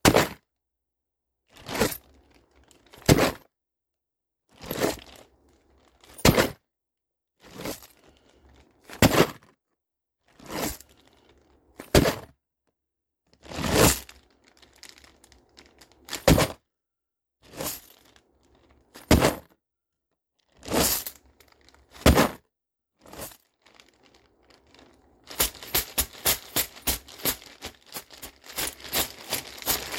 Sound effects > Objects / House appliances
FOLYProp Money Bag, Drop, Pick Up, Shaking Nicholas Judy TDC
A money bag dropping, picking up and shaking.